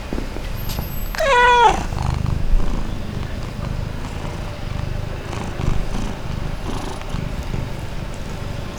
Sound effects > Animals
250629 Perran greeting meow - MKE600
Subject : Date YMD : 2025 June 29 Sunday Morning (07h30-08h30) Location : Albi 81000 Tarn Occitanie France. Sennheiser MKE600 with stock windcover P48, no filter. Weather : Sunny no wind/cloud. Processing : Trimmed in Audacity. Notes : She often meows when we come close to her or about to pet her. But she doesn't meow when she comes over for pets or anything, she just meows for food otherwise. Tips : With the handheld nature of it all. You may want to add a HPF even if only 30-40hz.
Tascam, mke600, meowing, FR-AV2, mke-600, meow, cat, Perran, Middle-aged, greeting, hello, fat, female, indoor